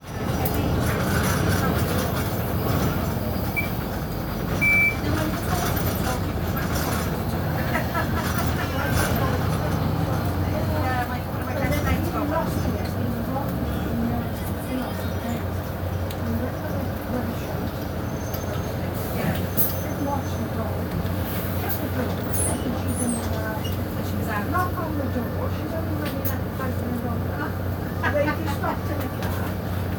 Soundscapes > Urban
Recorded on an iPhone SE. Stop announcements, local ladies chatting and laughing, engine noise, bus squeaking, beeping.